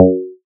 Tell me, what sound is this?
Instrument samples > Synths / Electronic
FATPLUCK 2 Gb
additive-synthesis, fm-synthesis, bass